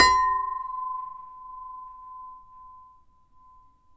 Sound effects > Other mechanisms, engines, machines
metal shop foley -061
bam, bang, boom, bop, crackle, foley, fx, knock, little, metal, oneshot, perc, percussion, pop, rustle, sfx, shop, sound, strike, thud, tink, tools, wood